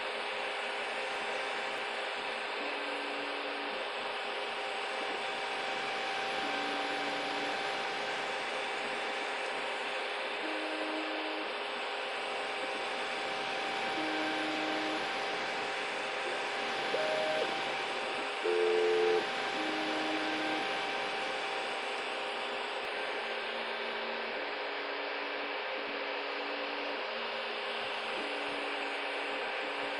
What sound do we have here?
Sound effects > Other mechanisms, engines, machines
MACH 3D Printer Normal Printing
3d printer Print head movement Fast, medium and slow plus Fan noise recorded with a Zoom H4n
fdm, printer, printing, sfx, 3d-printer